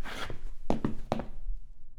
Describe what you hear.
Sound effects > Human sounds and actions
footsteps, shuffle, hardwood01
recorded with rode nt1